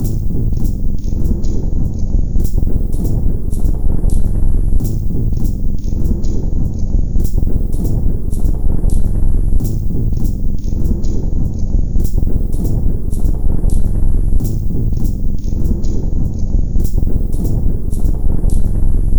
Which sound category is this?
Soundscapes > Synthetic / Artificial